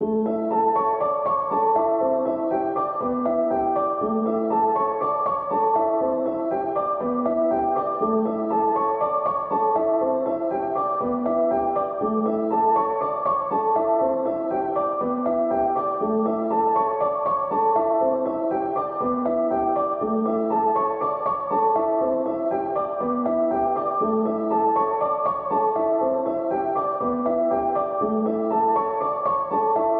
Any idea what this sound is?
Music > Solo instrument
Piano loops 132 efect 4 octave long loop 120 bpm
120, 120bpm, free, loop, music, piano, pianomusic, reverb, samples, simple, simplesamples